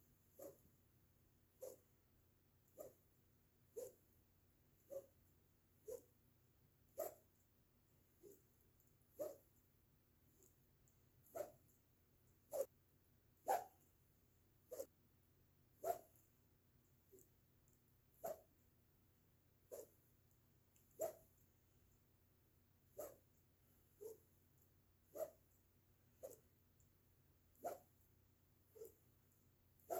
Sound effects > Objects / House appliances
Low pitched swishes.